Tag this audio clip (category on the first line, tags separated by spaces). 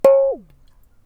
Sound effects > Objects / House appliances
bonk clunk drill fieldrecording foley foundobject fx glass hit industrial mechanical metal natural object oneshot perc percussion sfx stab